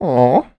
Speech > Solo speech
Affectionate Reactions - Awwwn
affectionate, awwn, dialogue, FR-AV2, Human, Male, Man, Mid-20s, Neumann, NPC, oneshot, reaction, singletake, Single-take, sound, talk, Tascam, U67, Video-game, Vocal, voice, Voice-acting